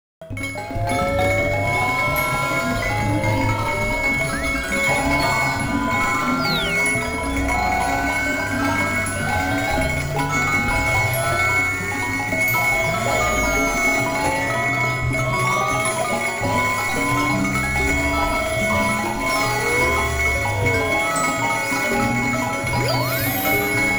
Soundscapes > Synthetic / Artificial
Grain Baby Mobile 2
experimental, effects, sample, soundscapes, samples, sfx, royalty, sound, glitch, electronic, packs, noise, granulator, free